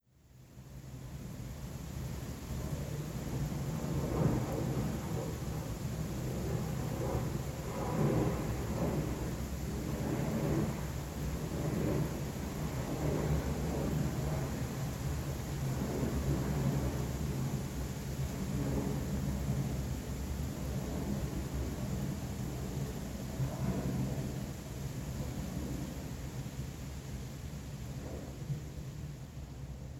Sound effects > Vehicles
AEROJet-Samsung Galaxy Smartphone, CU Pass Overhead in Rain 01 Nicholas Judy TDC
A jet passing overhead in rain.
jet, overhead, pass, Phone-recording, rain